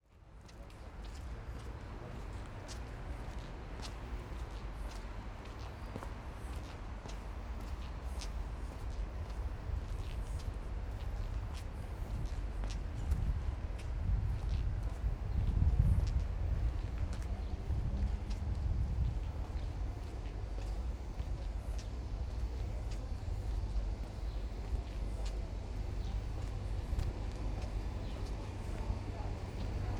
Soundscapes > Urban
09. AMBIENCE Jarmark Jakubowy Return Walk Crowd Festival Market Cathedral Street Old Town Music Traffic Zoom F3 SO.1
Jarmark Jakubowy 2025.07.26 Annual festival held by Archcathedral in Szczecin. Field recording in the Old Town district, including crowd, traffic, marketplace, discussion, background music, children, conversation and city ambience. Recorded with Zoom F3 and Sonorous Objects SO.1 microphones in stereo format.
2025, ambience, anturium, cathedral, crowd, fair, field-recording, marketplace, oldtown, people, poland, so1, sonorousobjects, stereo, street, szczecin, traffic, zoomf3